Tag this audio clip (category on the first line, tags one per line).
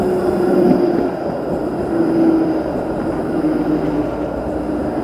Soundscapes > Urban
TramInTampere
Rattikka
Tram